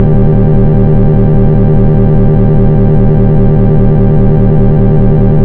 Sound effects > Other mechanisms, engines, machines
EMD 567 engine idle/notch 1 (synth recreation)
This sound is great for train simulators!
engine, freight, locomotive, mover, prime, rail, railroad